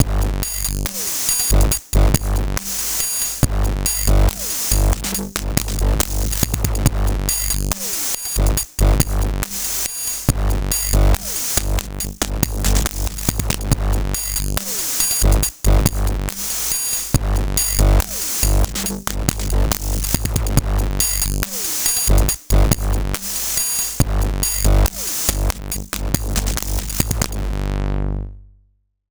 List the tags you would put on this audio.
Music > Other
experimental trippy loop drums

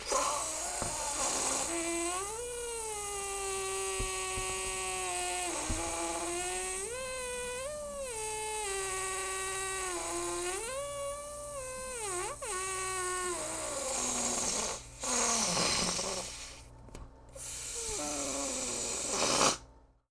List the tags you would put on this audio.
Sound effects > Objects / House appliances
air balloon Blue-brand Blue-Snowball deflate rubber squeal

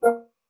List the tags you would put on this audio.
Sound effects > Electronic / Design
interface ui game

